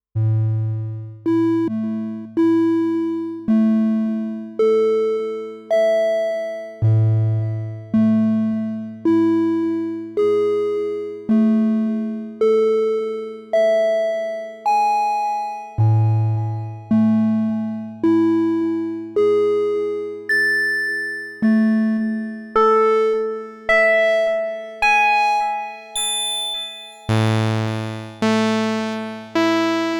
Music > Solo instrument
Soma Terra Ambient, Relax and Meditation #009 Start Calm
This is a recording which I did with the Soma Terra. Blip blops, ping pongs, saturated sounds, calm and suddenly not calm any more You can definitely cut some parts and make your songs out of it :) Recorder: Tascam Portacapture x6.
ambient, bell, blip, dreamscape, meditation, relax, relaxation, relaxing, soma-terra, soundscape